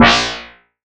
Instrument samples > Synths / Electronic
SLAPMETAL 1 Bb
bass, fm-synthesis, additive-synthesis